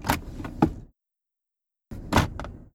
Sound effects > Vehicles
VEHMech-Samsung Galaxy Smartphone, CU Glove Compartment, Open, Close Nicholas Judy TDC
A glove compartment opening and closing.
close, foley, glove-compartment, open, Phone-recording